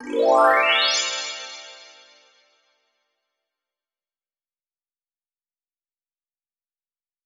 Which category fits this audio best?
Sound effects > Electronic / Design